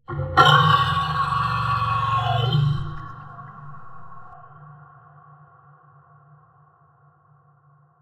Sound effects > Experimental
Creature Monster Alien Vocal FX-10
Echo, Snarling, Ominous, evil, sfx, Monstrous, gutteral, scary, fx, Vocal, devil, visceral, Alien, Reverberating, boss, Creature, Fantasy, Growl, Animal, Vox, Groan, gamedesign, Deep, Frightening, Sound, Snarl, Otherworldly, Monster, Sounddesign, demon